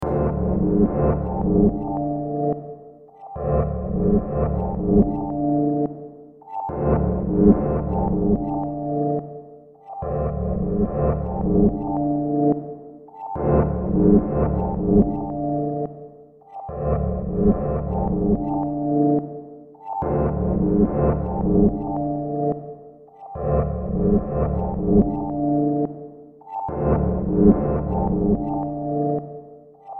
Soundscapes > Synthetic / Artificial
Looppelganger #173 | Dark Ambient Sound
Use this as background to some creepy or horror content.